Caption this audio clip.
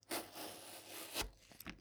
Sound effects > Other
Long slice vegetable 10
Chef, Chief, Cook, Cooking, Cut, Home, Indoor, Kitchen, Knife, Slice, Vegetable